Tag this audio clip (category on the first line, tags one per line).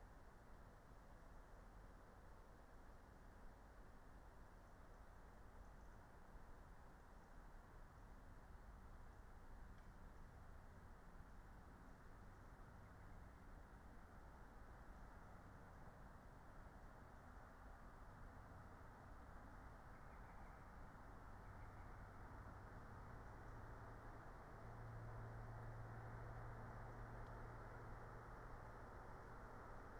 Soundscapes > Nature
soundscape
natural-soundscape
field-recording
alice-holt-forest
nature